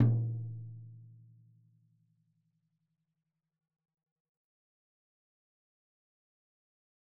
Music > Solo percussion
Med-low Tom - Oneshot 59 12 inch Sonor Force 3007 Maple Rack
quality, Medium-Tom, loop, tomdrum, recording, wood, roll, maple, med-tom, real, drums, Tom, oneshot, beat, realdrum, perc, toms